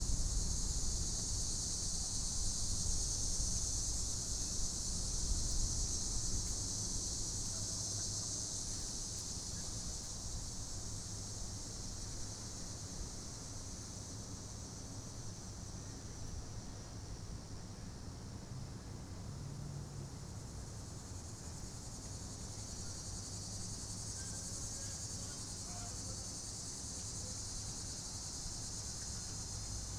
Sound effects > Natural elements and explosions
Summer trees grant 8312025
Trees at main library Columbus Ohio. Summertime. I recorded on zoom h1 essential